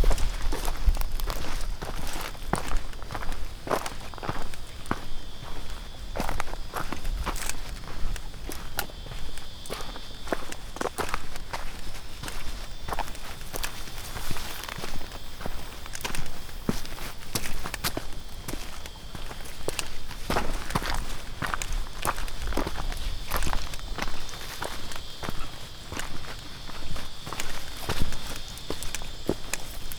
Soundscapes > Nature
Footsteps walking bush-walk hike summer australia